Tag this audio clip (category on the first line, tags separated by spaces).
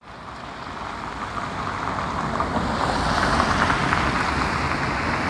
Soundscapes > Urban
vehicle car traffic